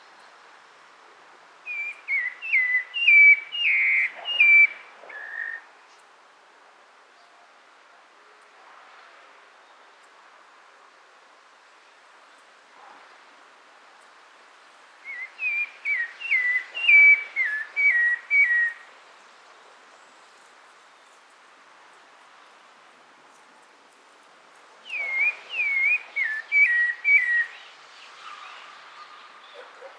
Sound effects > Animals
BIRD SINGS / PAJARO CANTANDO
PAJARO CANTANDO. GRABADO UNA TARDE DE VERANO EN ARGUELLO, CORDOBA, ARGENTINA. SE USO UN MKH416 Y ROLAND R26 -------------------------------------------------------------------------------------------------------------------------- SINGING BIRD. RECORDED ONE SUMMER AFTERNOON IN ARGUELLO, CORDOBA, ARGENTINA. USING AN MKH416 AND ROLAND R26